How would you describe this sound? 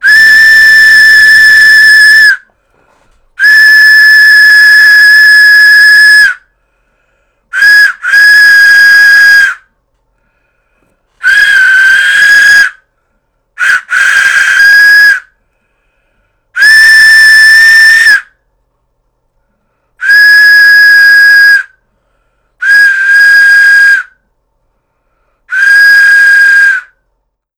Sound effects > Objects / House appliances
WHSTMech-Blue Snowball Microphone, CU Steam Train Whistle, Acme Windmaster Nicholas Judy TDC
A steam train whistle. Simulated using an Acme Windmaster.
train, Blue-brand, acme-windmaster